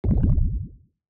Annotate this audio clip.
Sound effects > Electronic / Design
This sound was made and processed in DAW using only my samples and synths; - Bubbles sound effect for some potion making sounds or bubbles and underwater situations. Very blup-bluppy i'd say. For this one i used a singular saw wave... And a LOT of processing chains to make it sound like bubbly bubbles! - Ы.
alchemy, blup-blup, bubble, bubbles, bubbling, gurgle, liquid, potion, sink, stream, underwater, water, watery